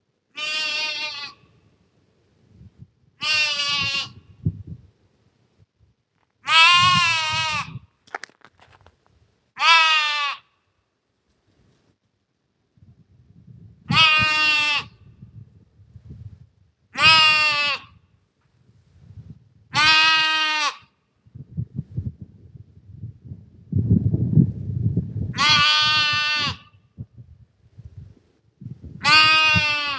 Sound effects > Animals
Young goat bleating. Field recording, with Samsung phone.
bleating, farm